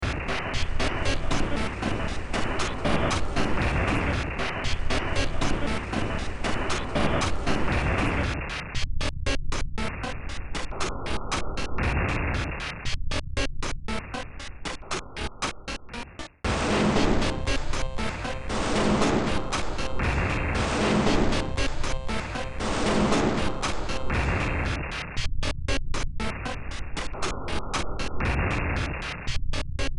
Music > Multiple instruments
Demo Track #3137 (Industraumatic)
Ambient, Cyberpunk, Games, Horror, Industrial, Noise, Sci-fi, Soundtrack, Underground